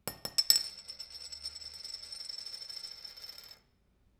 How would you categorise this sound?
Sound effects > Objects / House appliances